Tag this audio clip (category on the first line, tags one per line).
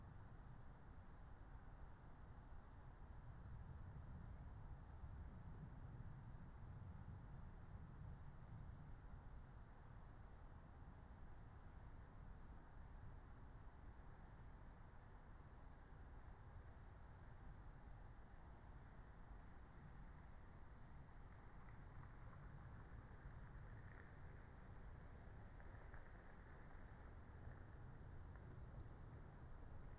Soundscapes > Nature
soundscape
natural-soundscape
raspberry-pi
phenological-recording
meadow
alice-holt-forest
nature
field-recording